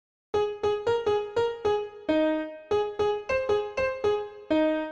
Music > Other
Break Core Piano Loop
this is a piano loop for a breakcore song
beat, piano, loop